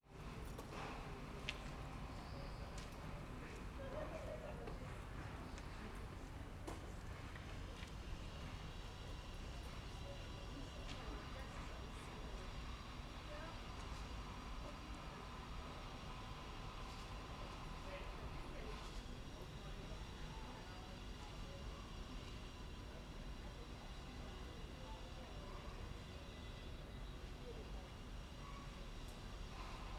Sound effects > Human sounds and actions
MALLORCA TOWN 01
Recorded around a mixed-use neighbourhood of Palma in the early evening. A side street near a busier main road. Recorded with a Zoom H6, compressed slightly
foley, mallorca, ambience, town